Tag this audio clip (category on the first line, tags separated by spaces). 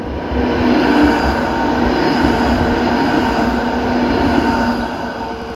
Soundscapes > Urban
tram traffic vehicle